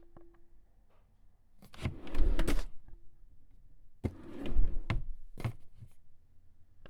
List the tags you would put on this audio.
Sound effects > Objects / House appliances
open
drawer

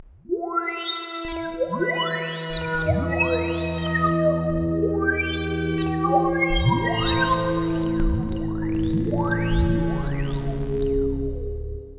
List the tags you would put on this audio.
Soundscapes > Synthetic / Artificial
content-creator
dark-techno
horror
mystery
noise
noise-ambient
PPG-Wave
science-fiction
sci-fi

